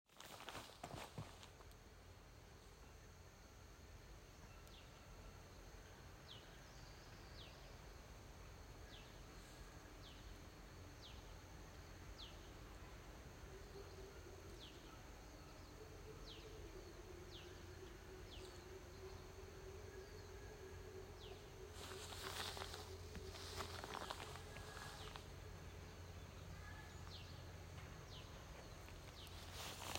Soundscapes > Nature
Countryside, Tran An - Vietnam.
Recording of Vietnamese countryside in Tran An. 6/1/25
Countryside, fieldrecording, birdsong